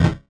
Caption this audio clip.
Sound effects > Electronic / Design
Cancel (Dead Tone)
ui-cancel, 8-bit, quit-game, ui-back, static-tone, cancel, dead-note, exit-menu, exit-game, 8-bit-cancel, close-window, exit, exit-window, close-game, static, cancel-sound